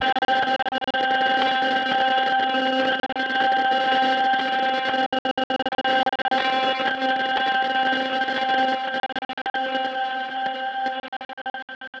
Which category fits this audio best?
Sound effects > Electronic / Design